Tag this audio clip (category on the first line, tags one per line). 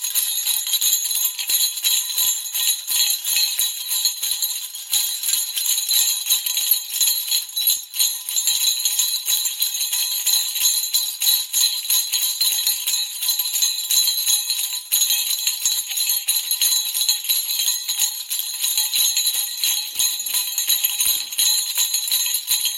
Music > Solo percussion
bells
fast
jingle
Phone-recording
sleigh